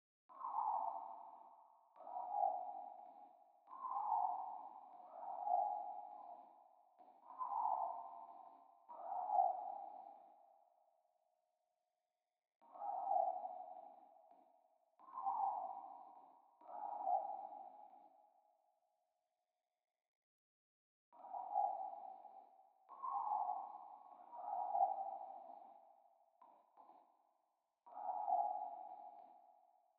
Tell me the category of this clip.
Sound effects > Animals